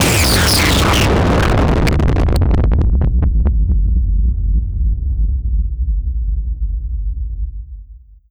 Sound effects > Electronic / Design
World Ender Explosion
The death machine was clearly labeled "DO NOT PRESS" Created by layering multiple piano notes and drums at the same time in FL Studio and passing the output through Quadrant VST. This was made in a batch of 14, many of which were cleaned up (click removal, fading, levelling, normalization) where necessary in RX and Audacity:
annihilation, apocalypse, armageddon, blast, cataclysm, chaos, collapse, destruction, detonation, devastation, distorted, domination, doom, eradication, event, explosion, extinction, fallout, fury, impact, implosion, inferno, meltdown, obliteration, oblivion, overkill, rupture, shockwave, void, wrath